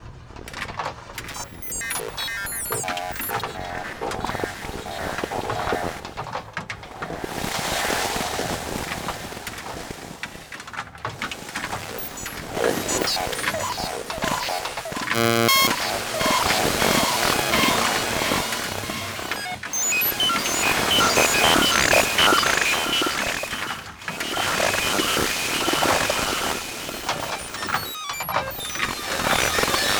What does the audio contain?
Sound effects > Electronic / Design
Konkret Jungle 17
From a pack of samples focusing on ‘concrete’ and acousmatic technique (tape manipulation, synthetic processing of natural sounds, extension of “traditional” instruments’ timbral range via electronics). This excerpt is based upon cut-up samples of a walk through melting ice and snow, juxtaposed against random (stepped) analog synth sequences.
acousmatic, extended-technique, ice, musique, objet-sonore, winter